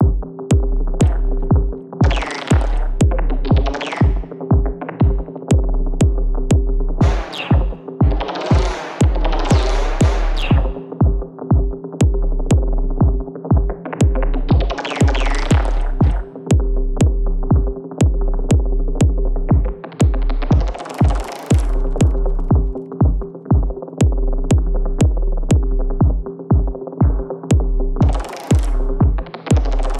Music > Multiple instruments
Industrial Electromagnetic Drum Loop at 120bpm
loopable, loop, industrial, drum, modern, rhythm, beat, electromagnetic